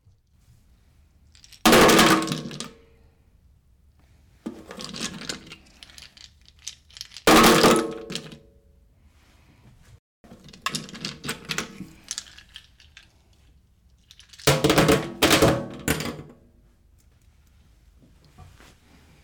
Sound effects > Objects / House appliances
ice cubes in metal sink
The sound of a handful of ice cubes being dropped into a metal sink in various ways
cube, sink, ice, metal